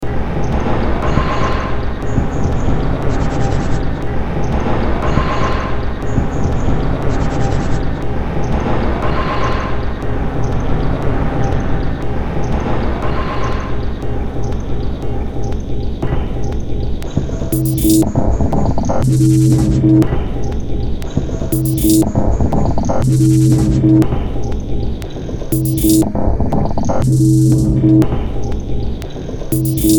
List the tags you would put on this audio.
Music > Multiple instruments
Sci-fi Games Industrial Ambient Noise Soundtrack Cyberpunk Underground Horror

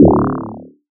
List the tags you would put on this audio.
Instrument samples > Synths / Electronic
additive-synthesis fm-synthesis bass

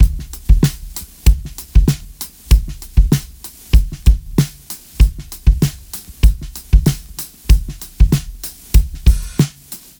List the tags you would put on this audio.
Music > Solo percussion
96BPM Acoustic Break Breakbeat Drum DrumLoop Drums Drum-Set Dusty Lo-Fi Vintage Vinyl

